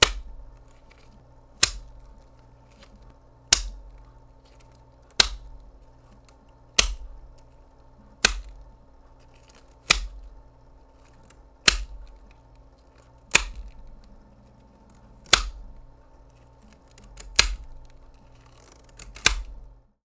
Sound effects > Objects / House appliances
RUBRImpt-Blue Snowball Microphone, CU Balloon Snap Nicholas Judy TDC
A rubber balloon snap.